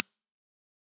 Sound effects > Other
SM57 Fender 15w home amp
Subject : A IR from deconvoluted sine sweep. From a Fender acoustasonic 15 guitar amp with a SM57 facing straight a tad to the side. Date YMD : 2025 December Location : Hardware : Tascam FR-AV2 Weather : Processing : Trimmed and normalised in Audacity. Notes : Tips : This is intended to be used with a convolution plugin. For a Amp sim effect.
Tone, Impulse-Response, Tone-IR, 15w, Deconvoluted-Sinesweep, Shure, Speaker-simulation, Amp-sim, Timbre, Sm57, acoustasonic, IR, fender